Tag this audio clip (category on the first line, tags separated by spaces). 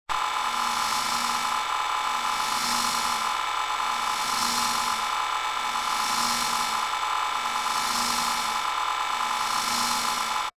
Sound effects > Electronic / Design
Creatures Automata Abstract Trippin Robotic Creature Buzz Glitch Digital Trippy Drone Spacey Synthesis Experimental Neurosis Mechanical Analog Otherworldly Alien Droid FX Noise